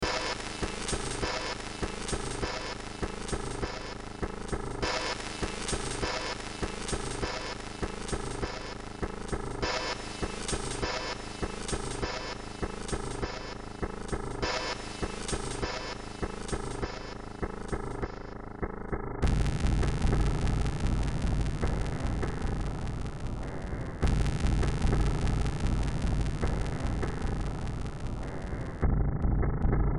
Music > Multiple instruments
Demo Track #2971 (Industraumatic)
Ambient, Horror, Underground, Industrial, Sci-fi, Noise, Games